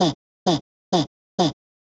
Speech > Solo speech
BrazilFunk Vocal Chop One-shot 17 130bpm
BrazilFunk, Vocal